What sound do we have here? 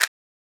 Sound effects > Objects / House appliances
Matchsticks ShakeBox 8 Shaker
shaker, matchstick-box, matchstick